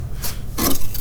Sound effects > Other mechanisms, engines, machines
Woodshop Foley-028
fx, perc, pop, tools, oneshot, sfx, bop, sound, boom, metal, percussion, tink, foley, crackle, bang, bam, knock, shop, strike, rustle, little, thud, wood